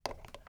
Sound effects > Other mechanisms, engines, machines
gun handle 4
Designed foley sound for less aggressive gun pickup from wooden table, with additional scrapes.
gun, scrape, soft, table, wood